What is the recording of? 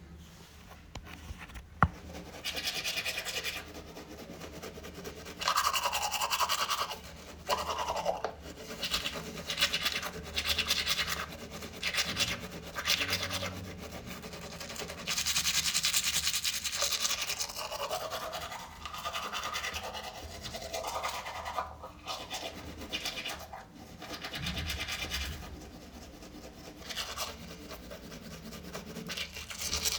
Sound effects > Human sounds and actions
HMNMisc brushing teeth MPA FCS2
Person brushing teeth